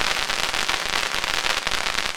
Sound effects > Natural elements and explosions
Firework pops
firework, fireworks, pops